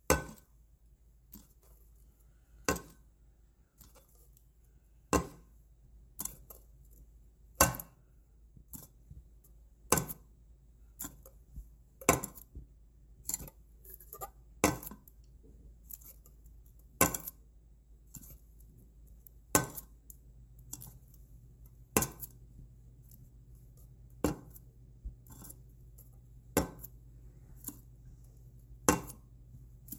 Sound effects > Objects / House appliances
OBJCont-Samsung Galaxy Smartphone, CU Can, Tin, Pick Up, Put Down Nicholas Judy TDC
A tin can picking up and putting down.
can, foley, tin